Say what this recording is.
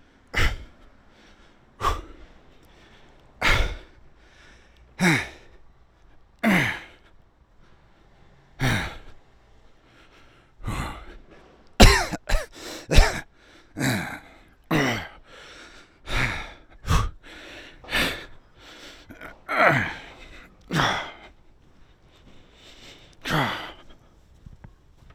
Sound effects > Human sounds and actions
Me just breathing, coughing into a mic.